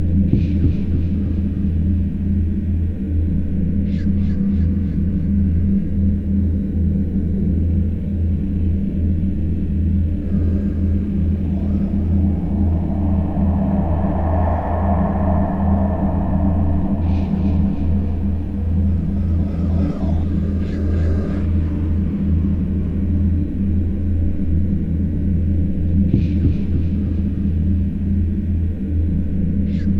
Soundscapes > Synthetic / Artificial
The Unidentified
Another soundscape made for a video game that never got released. Produced in Pro Tools, recorded with a Rode NTG3, and a whole bunch of frozen reverb pieced together. Cut to loop, works great for multiple horror-applications.
ambiance, ambient, background, creepy, freaky, ghosts, horrifying, horror, loop, paranormal, soundscape, thriller, voices, weird